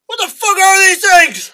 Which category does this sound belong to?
Speech > Solo speech